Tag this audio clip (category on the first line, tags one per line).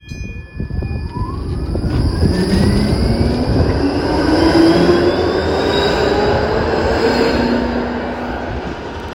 Soundscapes > Urban
Tram
Rail
Trains